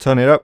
Speech > Solo speech
turn it up
chant, raw, un-edited